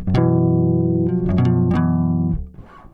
Music > Solo instrument
bluesy chord multi
chuny, lowend, funk, rock, bassline, blues, riff, note, chords, bass, low, pick, slides, harmonics, harmonic, notes, pluck, electricbass, slide, electric, basslines, riffs, slap, fuzz